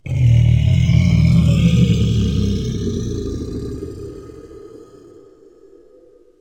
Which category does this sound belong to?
Sound effects > Experimental